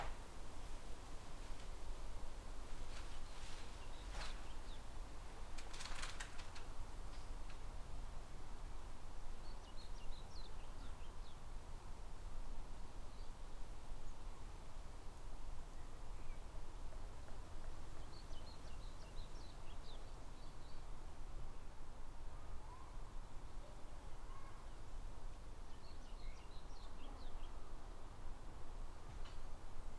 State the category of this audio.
Soundscapes > Urban